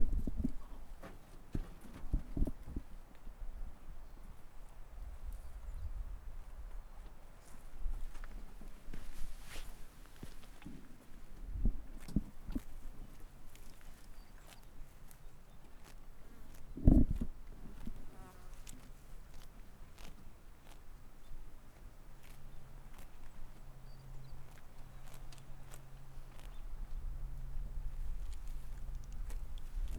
Soundscapes > Nature
Bears eating sedge meadow, Silver Salmon Creek
Coastal Brown Bears eating sedge meadow at Silver Salmon Creek, Alaska. You can hear humans walking around the tin trailers and ATVs
Alaska, Meadow, Bears, Eating